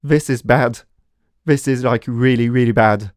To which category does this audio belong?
Speech > Solo speech